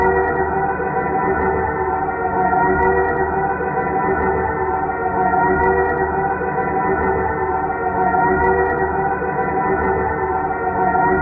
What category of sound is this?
Instrument samples > Percussion